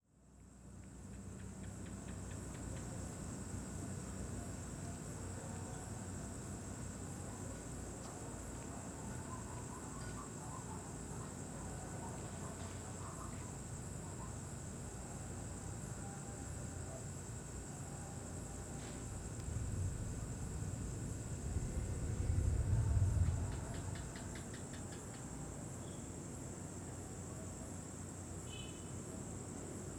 Soundscapes > Urban
250818 2933 PH Distant thunder in the evening
Distant thunder in the evening. I recorded this file at about 10:00PM, from the terrace of a house located at Santa Monica Heights, which is a costal residential area near Calapan city (oriental Mindoro, Philippines). One can hear the evening atmosphere of this place : insects, distant noises like traffic hum, voices, people singing (probably in a party or with karaoke), people walking in the street or eating dinner at home, dogs barking, and above all, some far thunder rolls, announcing a thunderstorm coming later in the night. Recorded in August 2025 with an Olympus LS-P4 and a Rode Stereo videomic X (SVMX). Fade in/out applied in Audacity.
insects, ambience, evening, thunderstorm, thunder, traffic, voices, atmosphere, rolling, thunder-roll, singing, barking, suburban, field-recording, soundscape, deep, Calapan-city, crickets, people, Philippines, distant, dogs